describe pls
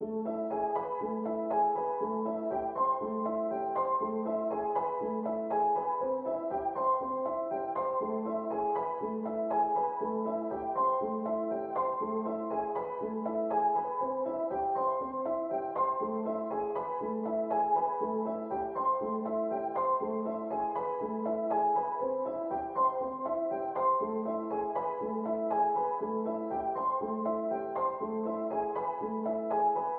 Music > Solo instrument
Piano loops 193 efect octave long loop 120 bpm
simplesamples, reverb, music, 120bpm, samples, free, pianomusic, piano, simple, loop, 20